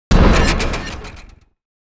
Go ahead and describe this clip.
Sound effects > Other
Sound Design Elements Impact SFX PS 068
audio, blunt, cinematic, collision, crash, design, effects, explosion, force, game, hard, heavy, hit, impact, percussive, power, rumble, sfx, sharp, shockwave, smash, sound, strike, thudbang, transient